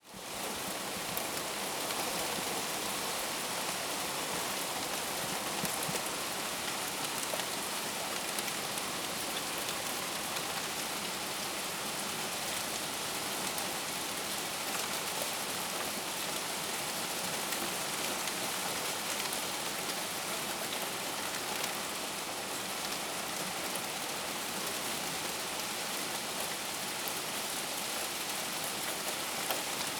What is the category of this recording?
Soundscapes > Nature